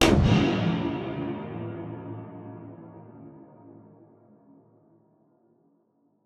Sound effects > Experimental
dark impact verb percs 16 by CVLTIVR
abstract, alien, clap, edm, experimental, fx, glitch, glitchy, hiphop, idm, impacts, laser, lazer, otherworldy, perc, percussion, pop, sfx, snap, whizz, zap